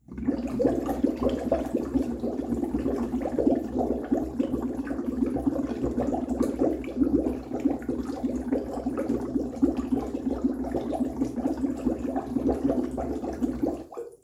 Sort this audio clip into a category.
Sound effects > Natural elements and explosions